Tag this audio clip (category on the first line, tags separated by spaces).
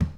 Sound effects > Objects / House appliances
shake tool liquid debris container household scoop metal pail clang drop hollow water object slam fill garden handle carry spill tip pour clatter knock kitchen plastic foley lid bucket cleaning